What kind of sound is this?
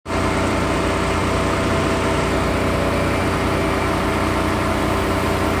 Soundscapes > Urban
Bus stopped 24
Where: Hervanta Keskus What: Sound of a bus stopped at a bus stop Where: At a bus stop in the evening in a cold and calm weather Method: Iphone 15 pro max voice recorder Purpose: Binary classification of sounds in an audio clip
bus, traffic, transport